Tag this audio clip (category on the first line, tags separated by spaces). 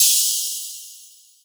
Instrument samples > Percussion
Synthtic Enthnic Magical Percussion FX Cymbal